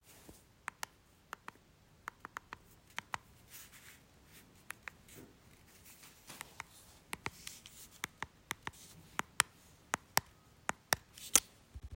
Sound effects > Objects / House appliances
Household objects for sound recognition game